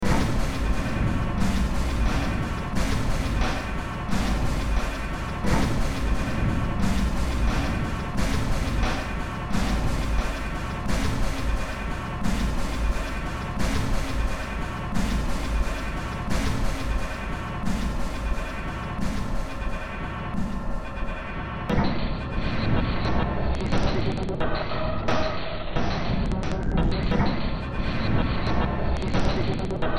Music > Multiple instruments
Demo Track #3025 (Industraumatic)
Ambient, Cyberpunk, Games, Horror, Industrial, Noise, Sci-fi, Soundtrack, Underground